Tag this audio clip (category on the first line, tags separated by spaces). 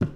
Sound effects > Objects / House appliances
plastic bucket carry clatter liquid metal spill drop foley lid tip pour